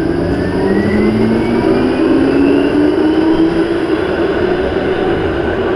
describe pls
Sound effects > Vehicles
Tram00045349TramDeparting
Tram departing from a nearby stop. Recorded during the winter in an urban environment. Recorded at Tampere, Hervanta. The recording was done using the Rode VideoMic.